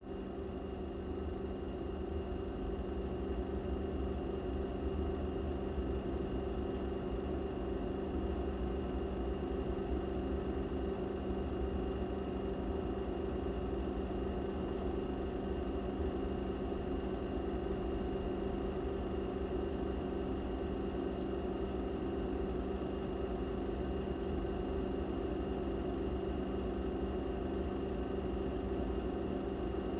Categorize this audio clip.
Sound effects > Objects / House appliances